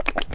Sound effects > Other mechanisms, engines, machines
shop foley-031
tink, boom, percussion, crackle, wood, sfx, perc, oneshot, rustle, sound, pop, bop, foley, knock, little, metal, bam, bang, shop, strike, fx, thud, tools